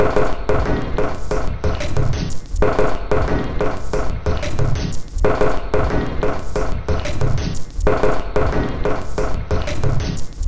Instrument samples > Percussion
This 183bpm Drum Loop is good for composing Industrial/Electronic/Ambient songs or using as soundtrack to a sci-fi/suspense/horror indie game or short film.
Alien, Ambient, Dark, Drum, Industrial, Loop, Loopable, Packs, Samples, Soundtrack, Underground, Weird